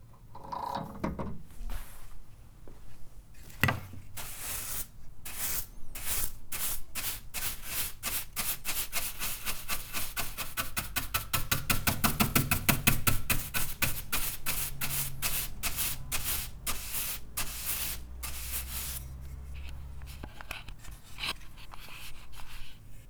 Sound effects > Objects / House appliances
paint brush wiping surface ambience foley-001
using paint brush to make perc sounds and beats
bristle, bristles, brush, brushing, delicate, paint, paintbrush, sfx, shop, soft, surface, wiping